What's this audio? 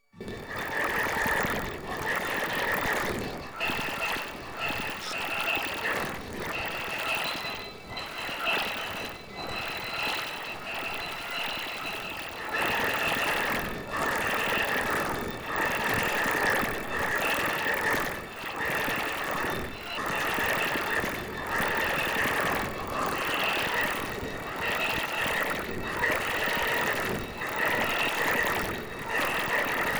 Sound effects > Electronic / Design
Rinsing The Knife
A sample of our blade sharpener is explored. This is an abstract noisy sample pack suitable for noise, experimental or ambient compositions.
abstract, ambient, noise, noise-ambient